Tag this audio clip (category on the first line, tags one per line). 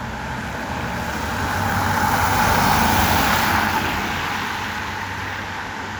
Soundscapes > Urban
Car
Drive-by
field-recording